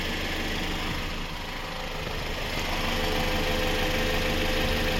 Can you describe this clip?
Sound effects > Other mechanisms, engines, machines
clip auto (13)
Description (Car) "Car Idling: whirring fans, moving pistons, steady but faint exhaust hum. Close-range audio captured from multiple perspectives (front, back, sides) to ensure clarity. Recorded with a OnePlus Nord 3 in a residential driveway in Klaukkala. The car recorded was a Toyota Avensis 2010."
Auto, Avensis, Toyota